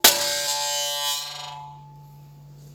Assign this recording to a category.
Sound effects > Objects / House appliances